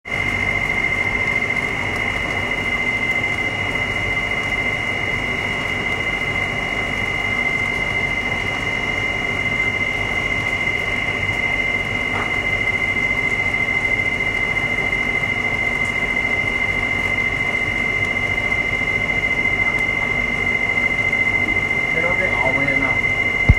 Sound effects > Other mechanisms, engines, machines
Máy Xoáy Đậu Nành - Machine To Fu Bean
Machine for make tofu. Record iPhone 7 Plus smart phone. 2025.01.28 06:16
tofu, machine